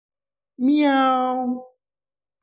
Sound effects > Human sounds and actions
Sound cat mimicked by a human being
animal, animals, cat, cats, kitten, kitty, meow, miaou, miaow, miau, pets, purr, purring